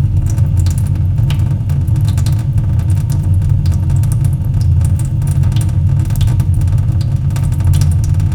Sound effects > Objects / House appliances
Water dripping into the sink recorded with contact and seismic microphone
Water dripping into the sink recorded with a mix of contact and seismic sensors.